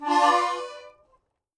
Music > Solo instrument
MUSCInst-Blue Snowball Microphone, CU Accordion, Slide Up Nicholas Judy TDC
An accordion slide up.
accordion, Blue-brand, Blue-Snowball, slide, up